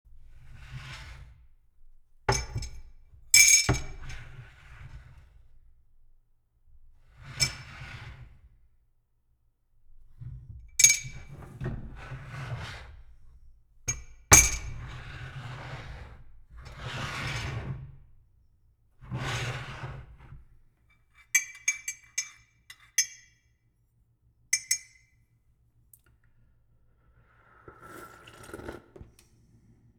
Sound effects > Objects / House appliances
Sliding Tea Mug Coffee Mug Sipping Drinking Stirring Tea
Dry version of "handling a teacup" sound I made.
coffee, cup, dry, mug, sipping, sliding, spoon, stirring, tea